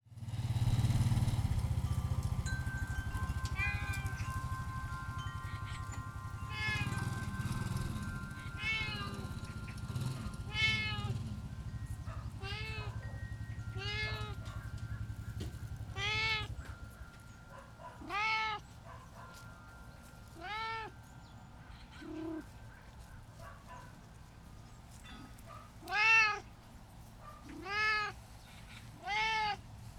Soundscapes > Urban
Rural Afternoon with Cat and Wind Chimes -Ganghwa island, Korea
UID: KR-GANGHWA-20251120-1620-002 Recorded in Dojang-ri, Ganghwa Island, Korea. This rural afternoon soundscape features wind chimes ringing in front of a small bookshop, a postman passing by on a motorbike delivering letters, a curious cat approaching the recorder, distant dogs barking, birds singing, and the steady rhythm of a carpenter repairing a nearby house, with an airplane flying overhead. 강화도 도장리에서 기록한 시골 마을의 오후 소리풍경이다. 책방 앞에 걸린 풍경이 바람에 울리고, 우편배달부가 오토바이를 타고 편지를 배달하며 지나간다. 녹음기에 호기심을 보이는 고양이가 다가오고, 멀리서 들려오는 개 짖는 소리와 새들의 지저귐, 그리고 목수가 집을 고치며 만들어내는 규칙적인 리듬 위로 비행기가 하늘을 가로질러 지나간다.
village, dogs, ganghwa, cat, wind-chimes, carpenter, rural, field-recording, postman, korea, ambience, birds, motorbike, afternoon, airplane